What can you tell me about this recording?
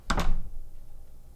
Sound effects > Objects / House appliances
Door Close 03
close, closing, door, house, indoor, slam